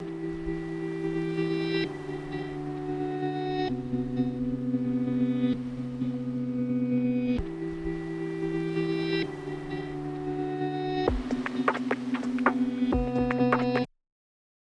Music > Multiple instruments
UK GARAGE SYNTH
cool synth for a nice uk garage beat. Part of a whole beat AI generated: (Suno v4) with the following prompt: generate an upbeat instrumental inspired in the uk garage genre, at 130 bpm, in E minor.
ai-generated, garage